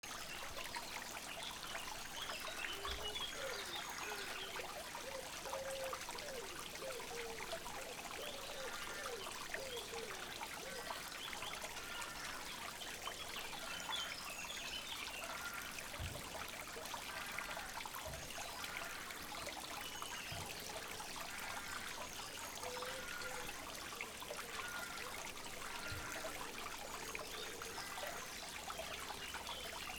Soundscapes > Nature
Woodlands Europe Spring Small Stream
A subtle and calm recording of a woodland stream in Europe featuring birdsong and trees blowing in the wind.